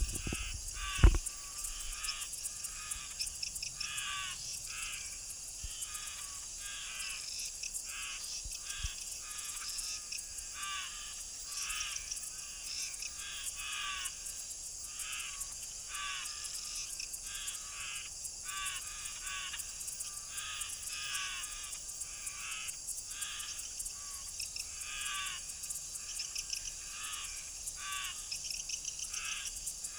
Soundscapes > Nature
Tinamou bird Peruvian Amazon jungle sound
undulated tinamou in the Peruvian amazon
bird, jungle